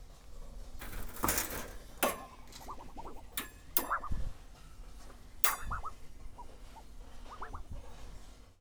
Sound effects > Objects / House appliances
Junkyard Foley and FX Percs (Metal, Clanks, Scrapes, Bangs, Scrap, and Machines) 156
Recording from the local Junkyard in Arcata, CA. Metallic bangs and clanks with machines running and some employees yelling in the distance. Garbage, Trash, dumping, and purposefully using various bits of metal to bop and clang eachother. Tubes, grates, bins, tanks etc.Recorded with my Tascam DR-05 Field Recorder and processed lightly with Reaper